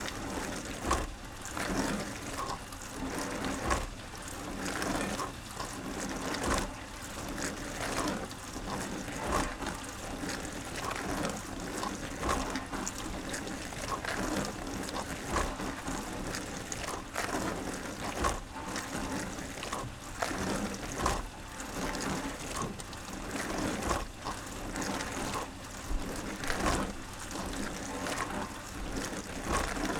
Sound effects > Objects / House appliances
Close-up AB recording of a dishwasher EM272 mics
dishwasher, lave-vaisselle, machine, water